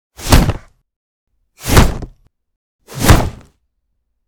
Sound effects > Human sounds and actions
custom heavy whoosh punch kick thud impact sound 10022025
bradmyers, karate, combat, big, bodyfall, whoosh, fighting, knockout, fight, impact, TMNT, punch, kick, heavy, maritalarts, fighter, thud, intense, battle, close, hit, jeffshiffman, kicking, nick, melee, attack, boxing, kung-fu, brawl